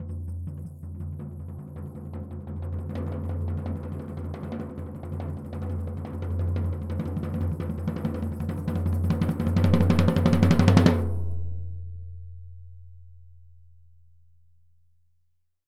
Music > Solo percussion
floor tom-slow roll with shaker - 16 by 16 inch
acoustic, beat, beatloop, beats, drum, drumkit, drums, fill, flam, floortom, instrument, kit, oneshot, perc, percs, percussion, rim, rimshot, roll, studio, tom, tomdrum, toms, velocity